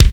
Instrument samples > Percussion
Synthwave-Kick1
Retouched from Kitton3 instrument plugin. Processed with FuzzPlus3, ZL EQ, Khs Distortion, Waveshaper, Fruity Limiter.
Acoustic, BassDrum, Kick, Synthwave